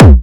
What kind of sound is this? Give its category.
Instrument samples > Percussion